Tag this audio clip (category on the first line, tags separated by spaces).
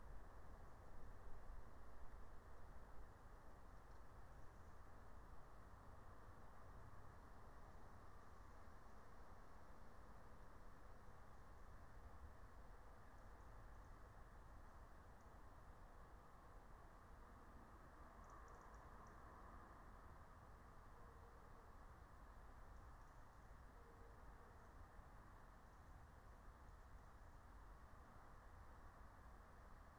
Soundscapes > Nature
soundscape meadow phenological-recording alice-holt-forest nature raspberry-pi natural-soundscape field-recording